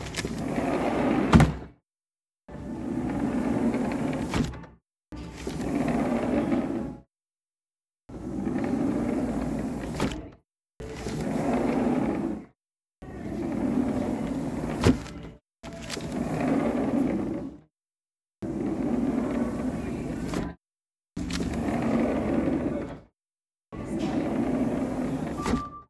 Sound effects > Objects / House appliances
DOORSlid-Samsung Galaxy Smartphone, CU Vinyl Patio Door, Slide Open, Close Nicholas Judy TDC
A vinyl patio door sliding open and closed. Recorded at Lowe's.
close, door, foley, open, patio, Phone-recording, slide, vinyl